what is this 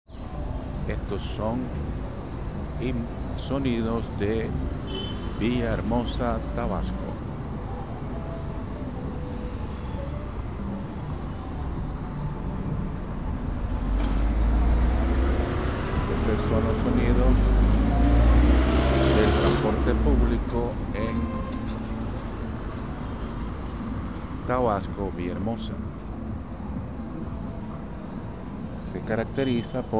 Soundscapes > Urban
Soundwalk from Parque Juárez to Parque Los Pajaritos (Villahermosa, Tabasco) / Caminata Sonora del Parque Juárez al Parque Los Pajaritos (Villahermosa, Tabasco)
It captures the urban soundscape between two parks in Villahermosa, Tabasco (Mexico): from the central and busy Parque Juárez to Parque Los Pajaritos. The recording features street ambience, traffic, voices, birds, and the transition from a noisy urban area to a quieter, green space. Captura el paisaje sonoro urbano entre dos parques de Villahermosa, Tabasco: del concurrido Parque Juárez al Parque Los Pajaritos. Se escuchan sonidos de la calle, tráfico, voces, aves y una transición sonora entre lo urbano y lo natural.
ambient, archivosonoro, binaural, birds, city, environment, field-recording, latinamerica, Mexico, nature, recording, sounds, soundscape, soundwalk, street, students, Tabasco, traffic, urban, urbanenvironment, urbanexploration, villahermosa